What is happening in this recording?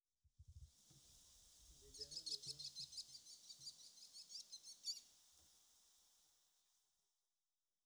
Soundscapes > Nature
American kestrel / crécerelle d'Amérique Tascam DR-60 RodeNTG3
nature, birdsong